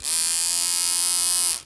Sound effects > Objects / House appliances
Electric shaver buzz
Subject : A beard shaver buzz. Date YMD : 2025 Location : Gergueil France. Hardware : Weather : Processing : Trimmed and Normalized in Audacity.
buzz, buzzing, electric, Shaver